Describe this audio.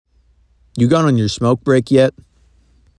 Speech > Solo speech
Recorded voice off of iPhone saying you're gone on your smoke break yet